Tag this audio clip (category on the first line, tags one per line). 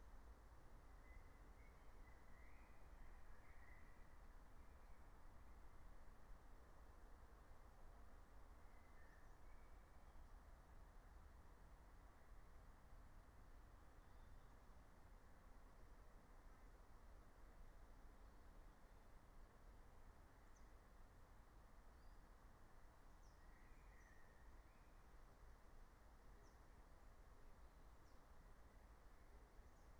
Soundscapes > Nature
alice-holt-forest
field-recording
nature
phenological-recording
raspberry-pi
soundscape